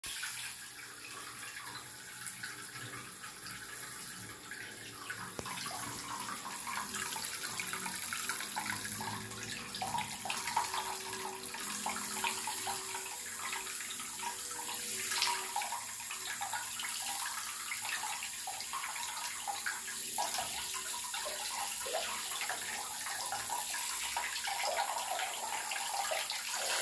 Sound effects > Natural elements and explosions
26 sec of water running
liquid, splash, water